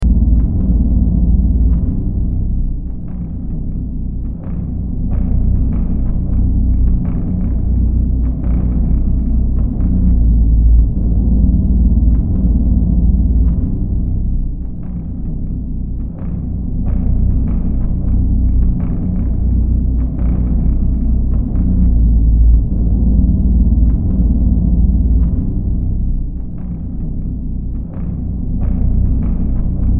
Soundscapes > Synthetic / Artificial
Looppelganger #164 | Dark Ambient Sound
Darkness, Weird, Ambient, Underground, Ambience, Survival, Gothic, Sci-fi, Hill, Horror, Games, Drone, Noise, Soundtrack, Silent